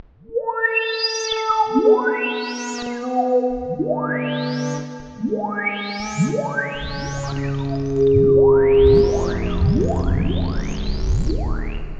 Soundscapes > Synthetic / Artificial
PPG Wave 2.2 Boiling and Whistling Sci-Fi Pads 5
mystery, sound-design, dark-soundscapes, noise, science-fiction, content-creator, scifi, dark-design, dark-techno, PPG-Wave, sci-fi